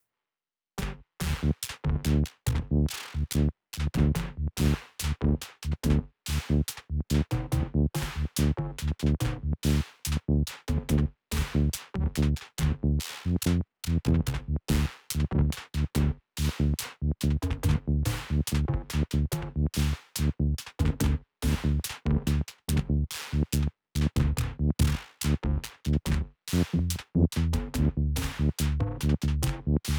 Music > Multiple instruments
clockwise works- BUMP 6
casio, electro, electro-organic, groovy, minimal, rhythm